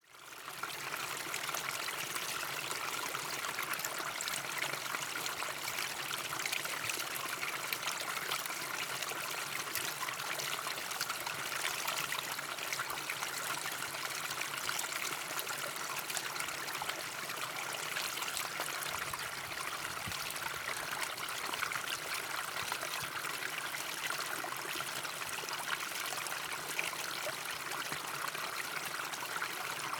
Soundscapes > Nature
Decoeli mountain stream
A mountain stream in the alpine below Mt. Decoeli in the Kluane Wildlife Sanctuary. Recorded at close range—less than a foot—on a Zoom H2n in 90-degree stereo mode
alpine, creek, decoeli, field-recording, kluane, kluane-wildlife-sanctuary, mountains, mountain-stream, stream, water, yukon